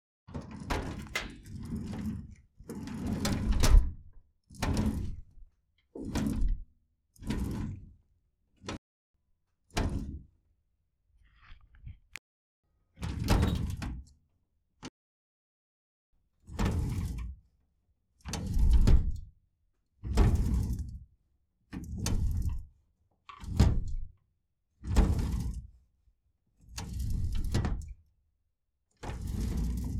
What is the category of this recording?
Sound effects > Objects / House appliances